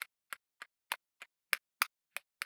Instrument samples > Percussion
MusicalSpoon Large Single Strike x8
Recorded On Zoom H5 XY5, AT897 Shotgun Mic, and SM57, and then Summed to Mono (all mics aprox < 3 feet away From source) Denoised and Deverbed With Izotope RX 11
Gallop, Hit, Horse, Minimal, Musical, Percussion, Slap, Spoon, Strike, Wood